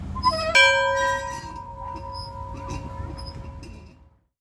Sound effects > Objects / House appliances
BELLLrg-Samsung Galaxy Smartphone, CU Rope Hung Bell, Ringing Nicholas Judy TDC
A rope hung bell ringing. Recorded at Luray Valley Museums and Gardens.
hung, Phone-recording, ring